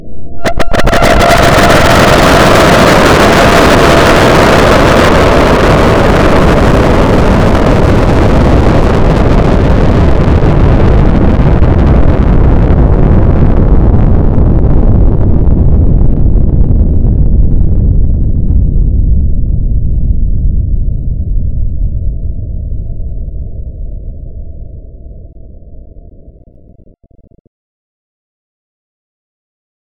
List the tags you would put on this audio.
Sound effects > Other
FLStudio Strange Waveform

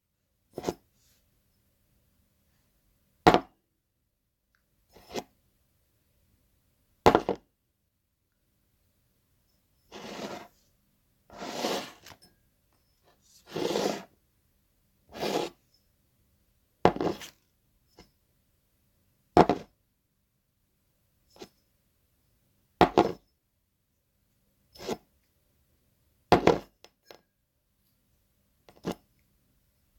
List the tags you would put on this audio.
Sound effects > Objects / House appliances
bang bottle glass hit impact knock place-down push slide tap tapping thud wine wine-glass

